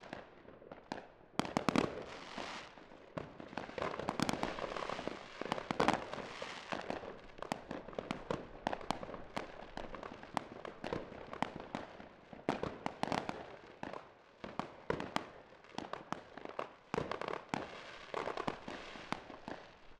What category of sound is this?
Sound effects > Natural elements and explosions